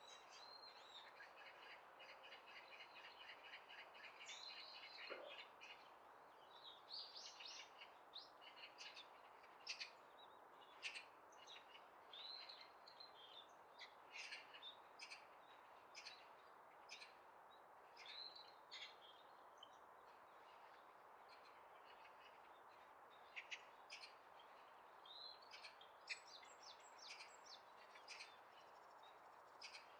Soundscapes > Nature
"December Bird" is a field recording captured during the late morning hours of a December day at Seoul Olympic Park. This recording features the birdsong and natural ambience of winter. The crisp winter air and quieter seasonal soundscape allow for clear, intimate captures of the birds' calls and movements.
ambient, birdsong, field, nature, recording, sounds